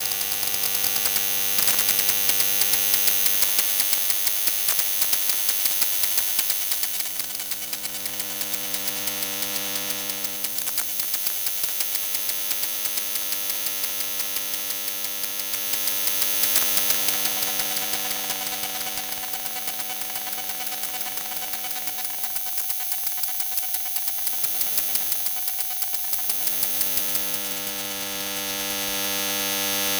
Sound effects > Objects / House appliances
Electromagnetic Field Recording of HP laptop
Electromagnetic field recording of a HP laptop by using a pickup coil and a Zoom h1n. Electromagnetic Field Capture: Electrovision Telephone Pickup Coil AR71814 Audio Recorder: Zoom H1essential